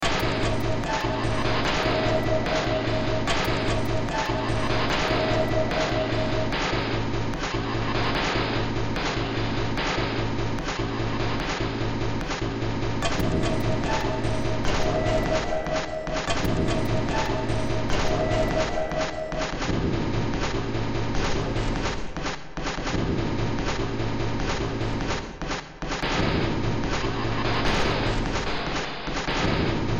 Music > Multiple instruments
Short Track #3761 (Industraumatic)
Ambient, Cyberpunk, Games, Horror, Industrial, Noise, Sci-fi, Soundtrack, Underground